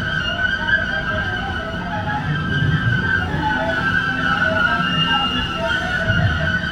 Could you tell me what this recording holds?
Soundscapes > Urban
GREENLINE 002 LOOPED
The sound of an MBTA Green Line train in downtown Boston screeching as it rounds a corner. This sound is looped smoothly.
subway, screeching